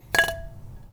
Music > Solo instrument
Marimba Loose Keys Notes Tones and Vibrations 34-001
block, foley, fx, keys, loose, marimba, notes, oneshotes, perc, percussion, rustle, thud, tink, wood, woodblock